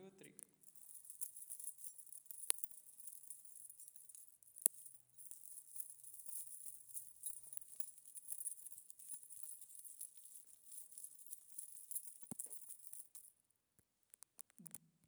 Sound effects > Human sounds and actions
OBJECTSJewelry Necklace twinkling metal sound jiggling NMRV FSC2
Jiggling up amnd down a metal necklace twinkling soft sound metal no hard sounds stars
Jiggling, Metal